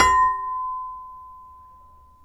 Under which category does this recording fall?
Sound effects > Other mechanisms, engines, machines